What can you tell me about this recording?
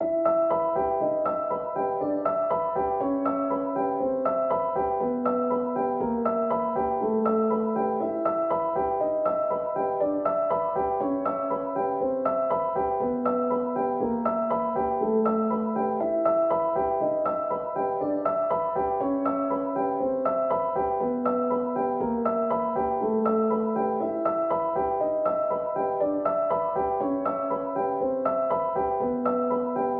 Music > Solo instrument
Piano loops 188 octave long loop 120 bpm
120, 120bpm, free, loop, music, piano, pianomusic, reverb, samples, simple, simplesamples